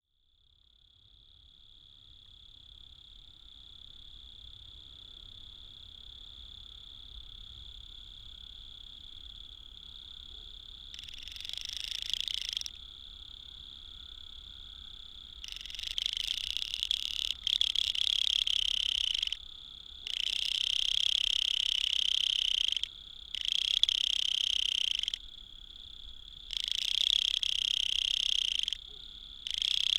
Soundscapes > Nature
Amphibians - Alzonne - 19-03-2023
Amphibian field Alzonne Recorded on March 19, 2023 with Audio Technica BP4025 microphone + Zoom H5 Champ de batraciens Alzonne Enregistré le 19 mars 2023 avec micro Audio Technica BP4025 + Zoom H5
Alzonne, amphibian, aude, field-recording, nature, night